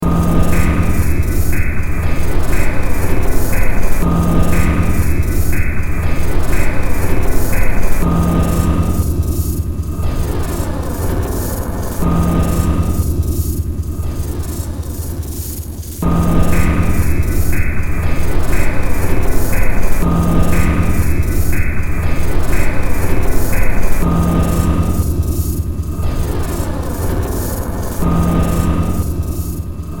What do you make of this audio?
Music > Multiple instruments
Demo Track #3499 (Industraumatic)
Soundtrack, Underground, Cyberpunk, Industrial, Ambient, Sci-fi, Noise, Games, Horror